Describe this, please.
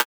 Instrument samples > Synths / Electronic
A snare rim one-shot made in Surge XT, using FM synthesis.